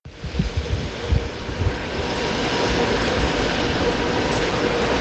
Sound effects > Vehicles
tram approaching medium speed
Tram approaching to pass by on a nearby road, at medium speed. Recorded on a walkway next to the tram track, using the default device microphone of a Samsung Galaxy S20+. TRAM: ForCity Smart Artic X34